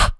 Speech > Solo speech
Very short exhaled voice.
vocal, male, speak